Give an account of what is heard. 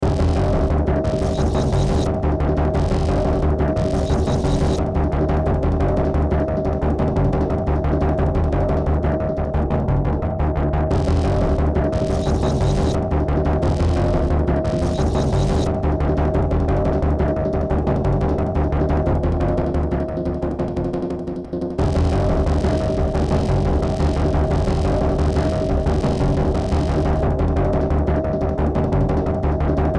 Music > Multiple instruments
Short Track #3096 (Industraumatic)
Ambient, Cyberpunk, Games, Horror, Industrial, Noise, Sci-fi, Soundtrack, Underground